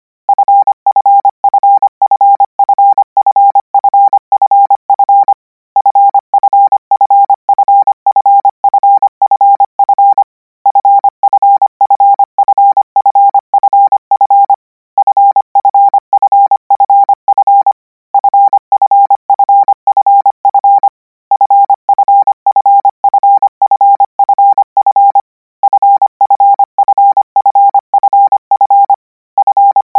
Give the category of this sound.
Sound effects > Electronic / Design